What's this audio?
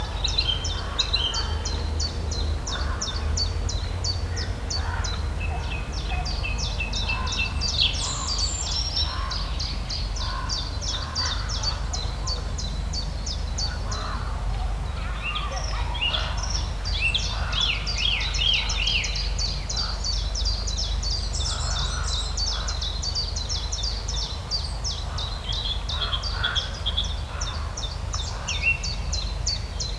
Soundscapes > Nature
Microphones in the Flowering Azalea - birds and bees (Bedgebury Forest)
📍 Bedgebury Pinetum & Forest, England 12.05.2025 6.50pm Recorded using a pair of DPA 4060s on Zoom F6 Birds identified by Merlin: Song Thrush, Common Chiffchaff, Rook, Common Chaffinch
azelea, bees, birds, birdsong, field-recording, forest, nature, spring